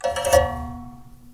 Sound effects > Other mechanisms, engines, machines
Dewalt 12 inch Chop Saw foley-028
Metal, Blade, Percussion, Scrape, Metallic, Tooth, Circularsaw, Tool, SFX, Teeth, Woodshop, Chopsaw, Perc, Workshop, Tools, Foley, Saw, FX, Shop